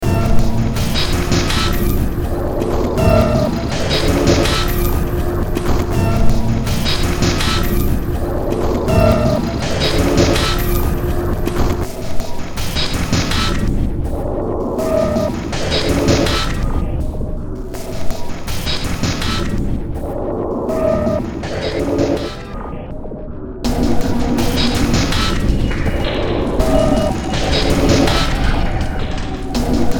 Music > Multiple instruments
Demo Track #3346 (Industraumatic)
Underground, Ambient, Horror, Games, Industrial, Cyberpunk, Sci-fi, Soundtrack, Noise